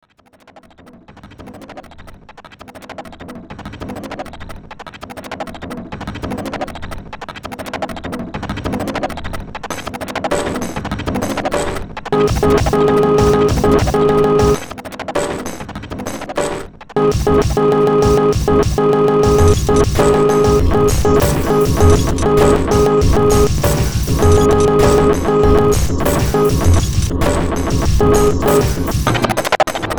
Music > Multiple instruments
Demo Track #2948 (Industraumatic)
Ambient, Cyberpunk, Games, Horror, Industrial, Noise, Sci-fi, Soundtrack, Underground